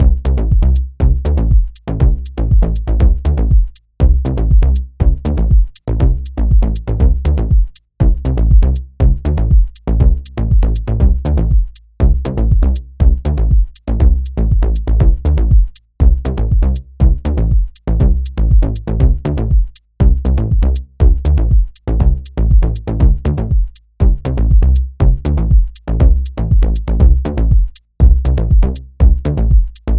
Music > Multiple instruments
Kick based electro loop 120BPM
Made in FL11